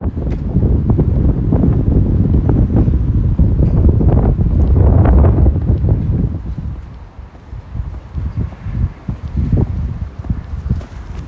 Soundscapes > Urban
A windy street in the city
City, Wind